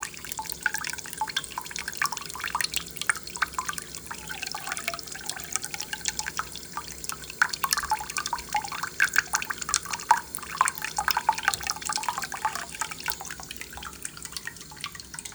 Sound effects > Natural elements and explosions
Tap Water Running
A continuous soft flow of water recorded from a household tap.
atmosphere, liquid, flow